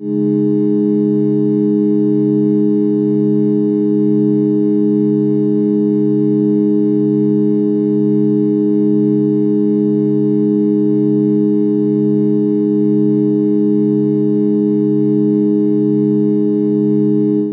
Instrument samples > Synths / Electronic
Synth Ambient Pad #011
Synth ambient pad with a slow atmospheric pad sound
ambient, cinematic, lush, one-shot, pad, space, space-pad, synth